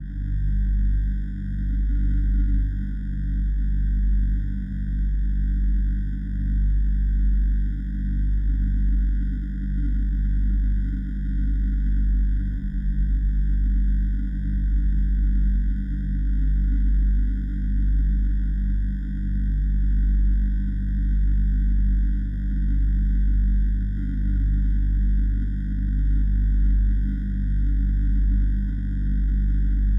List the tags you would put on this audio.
Soundscapes > Synthetic / Artificial
SCIFI
ELECTRICITY
EXPERIMENTAL
LOM
AMBIENCE

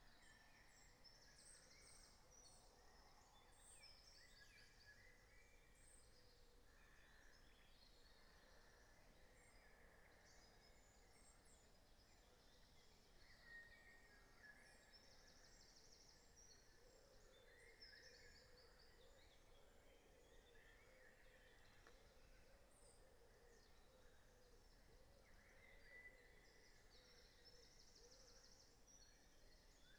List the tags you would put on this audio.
Soundscapes > Nature
sound-installation
weather-data
alice-holt-forest
natural-soundscape
Dendrophone
artistic-intervention
nature
data-to-sound
field-recording
raspberry-pi
phenological-recording
soundscape
modified-soundscape

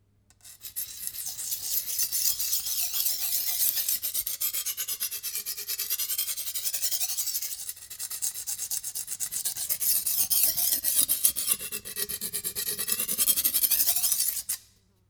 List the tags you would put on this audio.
Sound effects > Objects / House appliances
chef-knife
close-up
Dare
Dare2025-09
Dare2025-Friction
FR-AV2
Friction
Hypercardioid
Indoor
Knife
Metal
MKE-600
MKE600
rubbing
scrape
Sennheiser
sharpen
Sharpening
sharpening-stone
Shotgun-mic
Shotgun-microphone
Single-mic-mono
Stone
Tascam

